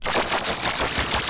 Sound effects > Electronic / Design
laser gun 1
Laser gun sound designed for a sci-fi videogame. It sounds like wubwubwubwubwub.
electronic, gun, laser, lasergun, sci-fi, sfx, shooting, videogame, videogames, weapon